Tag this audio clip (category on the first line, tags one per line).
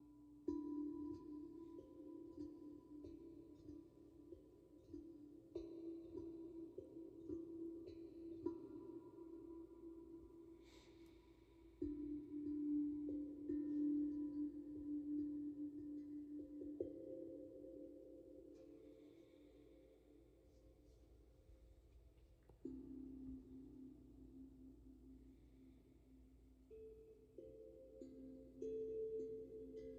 Music > Solo percussion
Ambient
Handpan
Outdoor